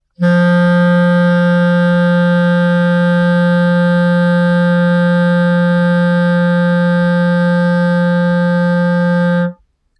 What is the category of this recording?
Instrument samples > Wind